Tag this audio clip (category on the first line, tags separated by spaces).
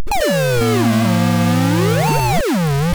Sound effects > Electronic / Design
Scifi Robotic Otherworldly Spacey Sci-fi Bass Handmadeelectronic Alien DIY Digital Noise noisey Electro Analog Electronic Glitch Sweep Instrument Synth Infiltrator Optical Experimental Trippy Robot Glitchy Theremin Dub Theremins SFX FX